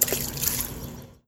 Sound effects > Natural elements and explosions
WATRSplsh-Samsung Galaxy Smartphone, CU Water, Splash, Small Nicholas Judy TDC
A small water splash.